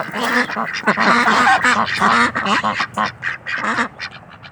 Sound effects > Animals
Waterfowl - Ducks; Various Domestic Ducks Quacking, Close Perspective
Recorded with an LG Stylus 2022.